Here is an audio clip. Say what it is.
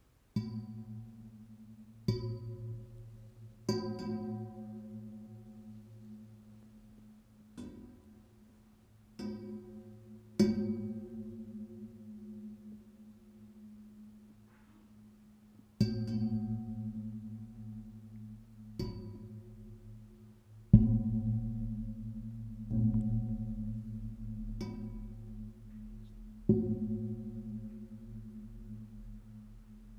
Sound effects > Human sounds and actions
Impact sound of a metal pipe, resonant metallic tone.
clang, foley, hit, industrial, metal, pipe, resonance, strike